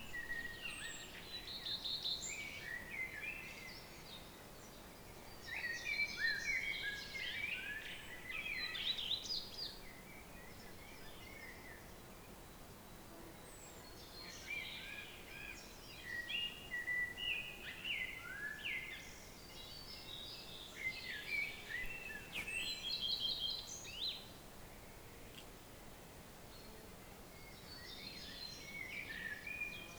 Sound effects > Natural elements and explosions
Summer evening Sweden
An evening atmosphere in the Swedish archipelago. Light wind in the trees. Several birds. Blackbird, Chaffinch and many other different birds. One bumblebee. No traffic
ambiance, birds, field-recording, forest, nature, Sweden, wind